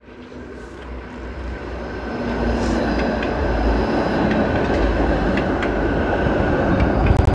Soundscapes > Urban
Tram passing Recording 11
Rail, Tram